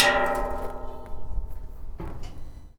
Sound effects > Objects / House appliances
Junkyard Foley and FX Percs (Metal, Clanks, Scrapes, Bangs, Scrap, and Machines) 61
Atmosphere, Dump, Metallic, waste, Metal, FX, rattle, Bash, Ambience, Clang, Clank, garbage, tube, Percussion, Robotic, Machine, rubbish, scrape, Perc, dumping, Foley, dumpster, Robot, SFX, Smash, Junkyard, trash, Environment, Junk, Bang